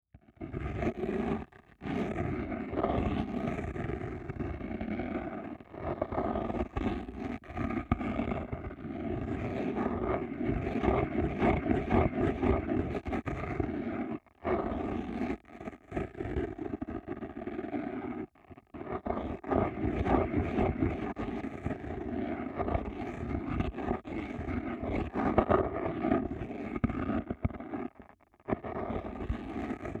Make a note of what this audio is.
Sound effects > Other
I used the Jez Riley French 'Ecoutic' contact microphone with probe. I inserted the probe into the core of an apple and used my fingernails to make the sound.
Contact, friction, Mic, movement, scrathing